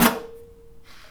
Sound effects > Other mechanisms, engines, machines
Handsaw Oneshot Metal Foley 9
foley, fx, handsaw, hit, household, metal, metallic, perc, percussion, plank, saw, sfx, shop, smack, tool, twang, twangy, vibe, vibration